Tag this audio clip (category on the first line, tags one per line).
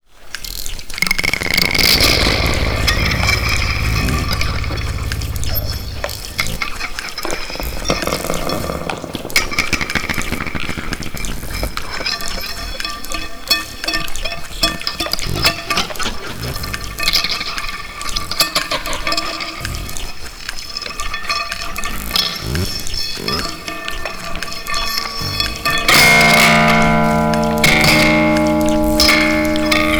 Sound effects > Objects / House appliances
junk
metal
musique-concrete
objet-sonore
acousmatic
Soma
Soundwich